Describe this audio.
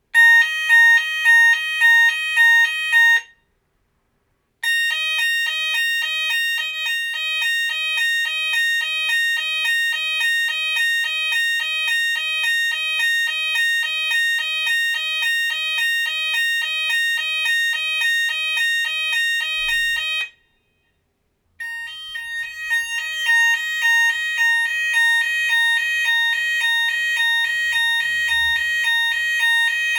Soundscapes > Indoors
Fire alarm. Excerpts from a much longer recording. Recorded in a small room via Zoom H2n, mid/side mode. Sometimes the mic moves of axis, filtering the incessant noise. "Don't worry, there isn't actually a fire, you see, the alarm is broken."
alarm, alert, beep, beeping, emergency, fire, fire-alarm, siren, warning